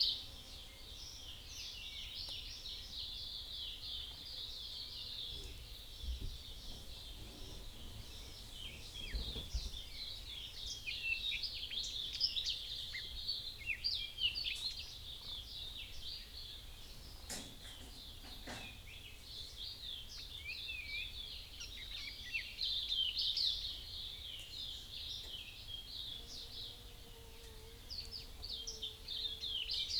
Soundscapes > Nature
birds dawn chorus Tsitsikamma South Africa
Early morning birdsong (around 5:00) at the Keurbos campsite in the Tsitsikamma wilderness in South Africa. I just put this outside my hut and left it unattended - as a result you can hear a lot of curious birds coming close to the recorder to check it out, making for some cool wing-flapping sounds. Recorded with Clippy EM272s on 19 January 2025.